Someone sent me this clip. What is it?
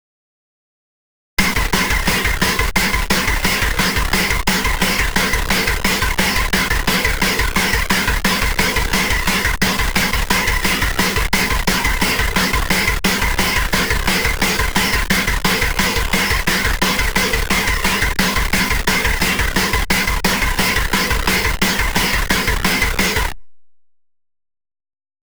Music > Solo percussion
Simple Bass Drum and Snare Pattern with Weirdness Added 042
FX-Laden-Simple-Drum-Pattern, FX-Drum-Pattern, Four-Over-Four-Pattern, FX-Laden, Experiments-on-Drum-Patterns, Snare-Drum, Experimental, Silly, FX-Drum, Glitchy, Noisy, Fun